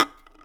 Sound effects > Other mechanisms, engines, machines
shop foley-021
boom, bam, bop, rustle, little, strike, tink, perc, pop, sound, foley, oneshot, shop, crackle, metal, bang, wood, sfx, thud, tools, percussion